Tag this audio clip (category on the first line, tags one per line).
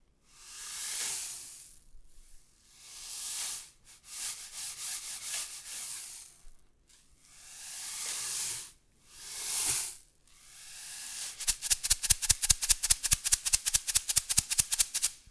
Instrument samples > Other
grain
home-made
rice